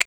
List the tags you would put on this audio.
Sound effects > Experimental
Button Click Select UI

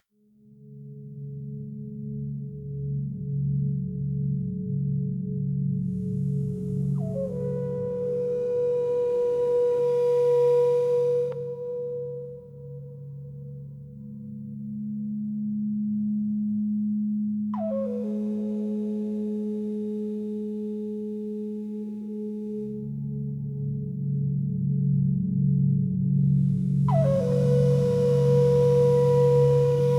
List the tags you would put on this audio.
Music > Multiple instruments
ai-generated ambient background earth experimental meditative organic pad relaxing soundscape texture tribal

